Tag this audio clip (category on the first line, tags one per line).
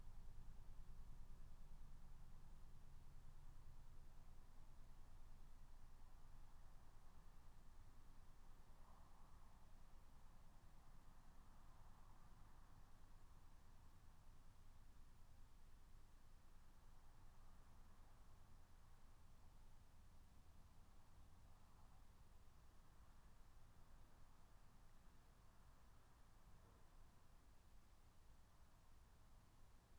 Soundscapes > Nature
field-recording
alice-holt-forest
nature
natural-soundscape
phenological-recording
soundscape
raspberry-pi
meadow